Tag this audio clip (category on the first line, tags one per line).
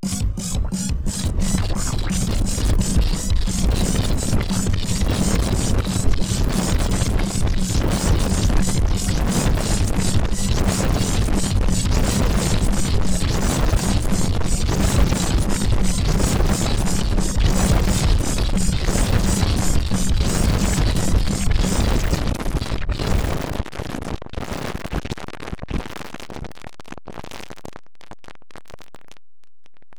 Music > Solo percussion
Bass-and-Snare
Bass-Drum
Experimental
Experimental-Production
Experiments-on-Drum-Beats
Experiments-on-Drum-Patterns
Four-Over-Four-Pattern
Fun
FX-Drum
FX-Drum-Pattern
FX-Drums
FX-Laden
FX-Laden-Simple-Drum-Pattern
Glitchy
Interesting-Results
Noisy
Silly
Simple-Drum-Pattern
Snare-Drum